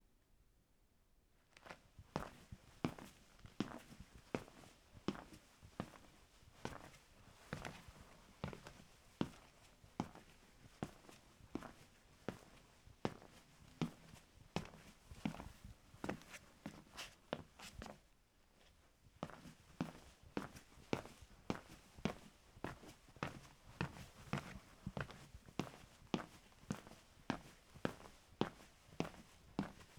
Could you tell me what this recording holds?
Sound effects > Human sounds and actions
Footsteps, Slow Walk to Run
The Sound of Footsteps on a Wood, Laminate Floor, going from a Slow Walk to a Light Run. Recorded with a ZOOM H6 and a Sennheiser MKE 600 Shotgun Microphone. Go Create!!!
footsteps, running